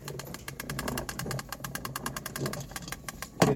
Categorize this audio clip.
Sound effects > Other mechanisms, engines, machines